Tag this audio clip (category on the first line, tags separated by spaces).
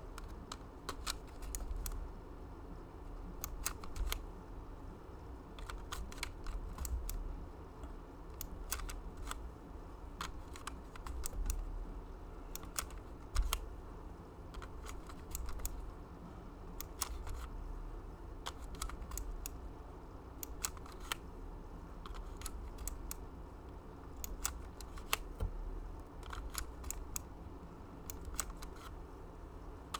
Sound effects > Objects / House appliances
Blue-brand Blue-Snowball console foley game insert nintendo-ds remove